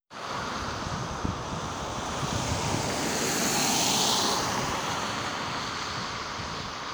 Sound effects > Vehicles
tampere car23
car, automobile, vechicle